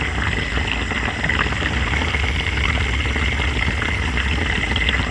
Sound effects > Objects / House appliances
A looping version of a recent hydrophone recording requested by a fellow member.
sink, hydrophome, water, tap, bathrrom